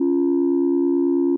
Instrument samples > Synths / Electronic
Landline Phonelike Synth C#5
Landline-Holding-Tone, Landline-Telephone-like-Sound, just-minor-3rd, Landline-Telephone, Landline-Phonelike-Synth, Tone-Plus-386c, JI-3rd, Landline, Synth, JI, Old-School-Telephone, just-minor-third, Landline-Phone, Holding-Tone, JI-Third